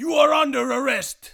Speech > Solo speech
you are under arrest
angry, male, man, police, voice, yelling